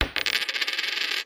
Sound effects > Objects / House appliances
OBJCoin-Samsung Galaxy Smartphone, CU Quarter, Drop, Spin 05 Nicholas Judy TDC
foley, Phone-recording, quarter